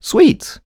Speech > Solo speech
Joyful - Sweet 1
dialogue, FR-AV2, happy, Human, joy, joyful, Male, Man, Mid-20s, Neumann, NPC, oneshot, singletake, Single-take, sweet, talk, Tascam, U67, Video-game, Vocal, voice, Voice-acting, word